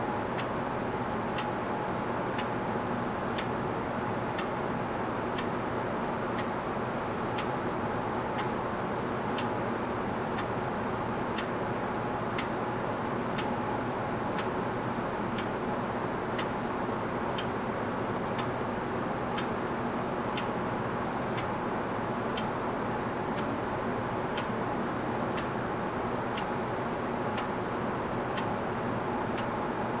Soundscapes > Indoors
Living Room Ambiance
General indoors ambiance, the gentle hum of a tower fan, and a ticking clock. Works great for a low noise-floor background room-tone. Cut to loop. Recorded with a Tascam DR-100mkii, processed in Pro Tools
ambient, background, indoors, interior, living-room, room-tone, white-noise